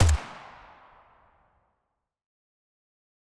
Sound effects > Electronic / Design
A rifle firing one-shot designed SFX created with Krotos's Weaponiser. Stereo. 96Khs.
one-shot, gun